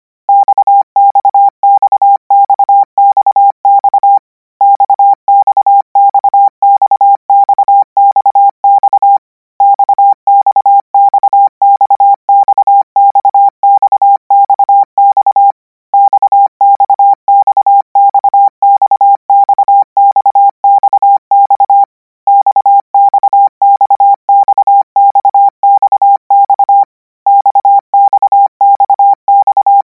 Sound effects > Electronic / Design
Koch 39 X - 200 N 25WPM 800Hz 90%
Practice hear letter 'X' use Koch method (practice each letter, symbol, letter separate than combine), 200 word random length, 25 word/minute, 800 Hz, 90% volume.
code, letras, letters